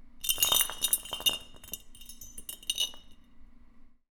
Sound effects > Objects / House appliances
Glass bottle rolling 3
A glass bottle rolling on a concrete floor (in the recycling room). Recorded with a Zoom H1.
Bottle, Concrete, Floor, Glass, Rolling, Wine